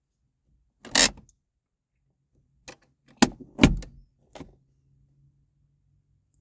Sound effects > Vehicles
Handbrake Engage, Disengage
Car handbrake engaging, then disengaging; A high pitched ratchet is audible, followed by a click and thud when disengaging the handbrake. Recorded on the Samsung Galaxy Z Flip 3. Minor noise reduction has been applied in Audacity. The car used is a 2006 Mazda 6A.